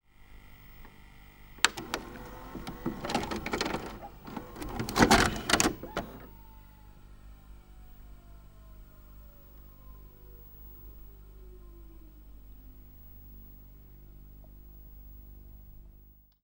Sound effects > Electronic / Design
VHS Eject
VHS tape ejecting from a Samsung DVD_V6800
eject, Samsung, tape, VCR, VHS